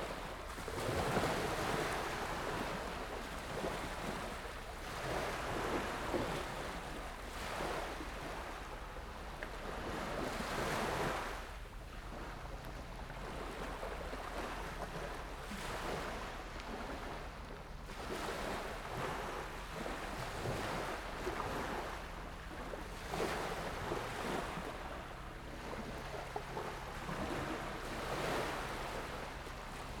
Soundscapes > Nature
Beach in the morning
Recorded with AT202 and RODE AI-1
beach, shore, waves, ocean, coast, sea, wave